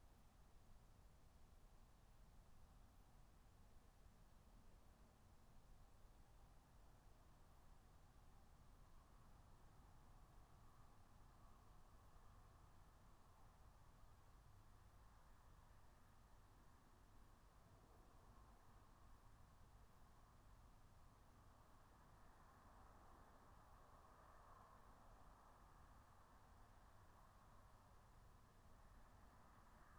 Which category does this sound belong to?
Soundscapes > Nature